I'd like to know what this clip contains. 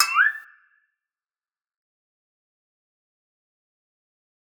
Instrument samples > Percussion
Flexatoneish Perc Drip 02A
Drip, Triangle, Perc, Dnb, Bend, Jungle, Percussion, Flexatone